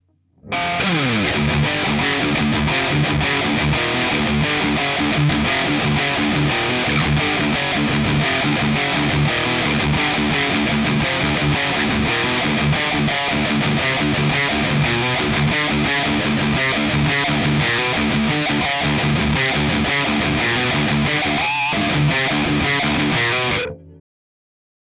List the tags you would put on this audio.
Music > Solo instrument
heavy
Metal
riff

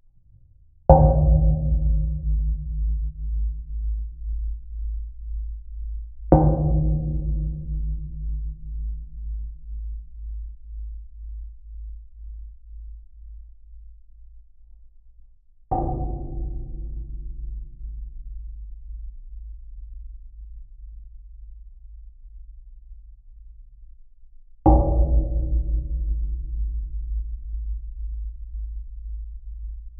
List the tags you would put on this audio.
Sound effects > Other mechanisms, engines, machines
bang
banging
geofone
hand-rail
hit
hitting
impact
knock
knocking
metal
metallic
percussion
percussive
rail
sfx
strike
striking

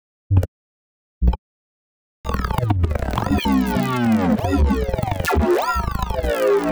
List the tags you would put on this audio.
Sound effects > Electronic / Design
DIY
FX
Gliltch
Impulse
Machine
Mechanical
Noise
Oscillator
Otherworldly
Pulse
Robotic
SFX
Synth

